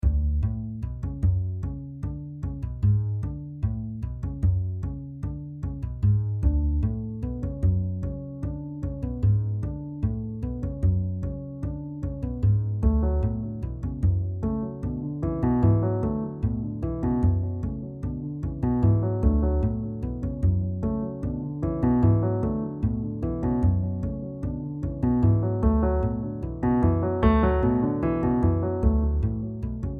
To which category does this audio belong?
Music > Multiple instruments